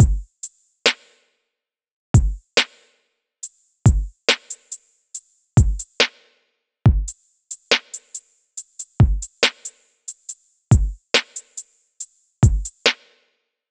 Instrument samples > Percussion
trap drums with reverb and too much punch (140bpm)
drum, loop, reverb, trap